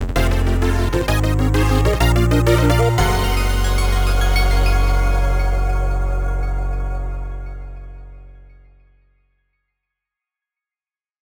Music > Multiple instruments
Victory Fanfare (8-Bit Thunder) 4
This mix has lots of 8-bit distortion.
victory, discover-location, quest-complete, video-game, triumph, video-game-mission-complete, cinematic-hit, dylan-kelk, triumphant, rpg-video-game, find-key, find-item, fanfare, get-item, discovery, video-game-level-up, mission-complete, level-up, rpg